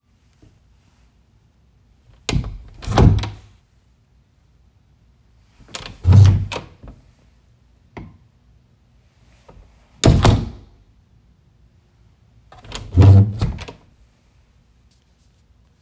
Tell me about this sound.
Sound effects > Objects / House appliances
Bedroom door in a house being closed and opened. Door is a little difficult to shut.